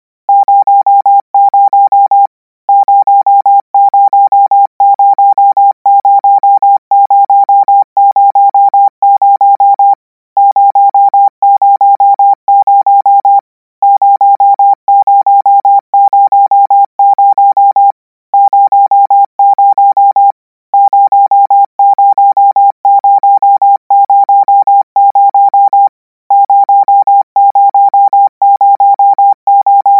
Sound effects > Electronic / Design
Koch 18 0 - 200 N 25WPM 800Hz 90%
Practice hear number '0' use Koch method (practice each letter, symbol, letter separate than combine), 200 word random length, 25 word/minute, 800 Hz, 90% volume.
code, codigo, letters